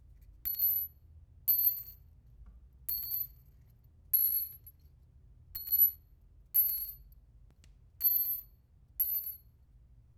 Sound effects > Objects / House appliances
Dropping thin nails individually on a cold floor. Recorded with Zoom H2.